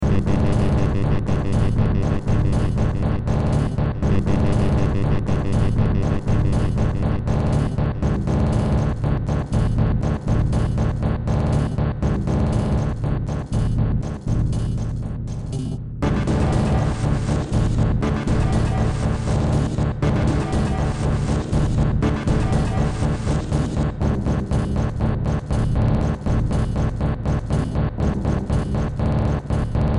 Music > Multiple instruments
Demo Track #3420 (Industraumatic)
Ambient, Cyberpunk, Games, Horror, Industrial, Noise, Sci-fi, Soundtrack, Underground